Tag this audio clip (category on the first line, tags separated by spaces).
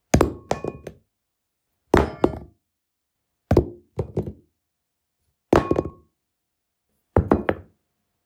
Sound effects > Objects / House appliances
blade carpet cook fight impact king kitchen knight knives lose monarch queen rpg sword throw weapon